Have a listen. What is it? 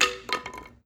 Sound effects > Objects / House appliances
WOODImpt-Samsung Galaxy Smartphone, CU Board Drop 04 Nicholas Judy TDC
A wooden board drop.
board, wooden, drop, foley, Phone-recording